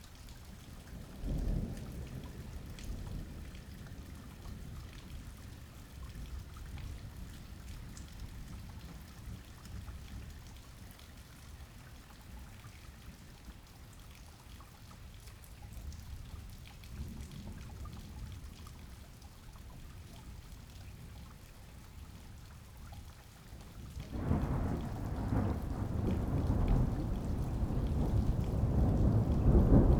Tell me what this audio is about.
Soundscapes > Nature
Thunderstorm, Provence, France. 2
Recording of a thunderstorm in rural Provence, France in april 2025. The is second of three perspectives. In this one there is less focus on raindrops. Recorded on 29th April 2025. Recorded using Sennheiser MKH 8040s in ORTF arrangement.
nature, thunderstorm, thunder, rain, field-recording